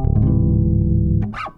Music > Solo instrument
suspended chord 3
pick, slide, notes, rock, slides, pluck, bass, slap, harmonic, riff, chuny, harmonics, fuzz, riffs, chords